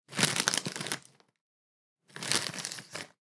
Sound effects > Other
FOODEat Cinematis RandomFoleyVol2 CrunchyBites Food.Bag TakeOneCrakerOut Freebie
bite, cracker, crunchy, foley